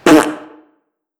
Sound effects > Human sounds and actions
Short Fart
shit, comedy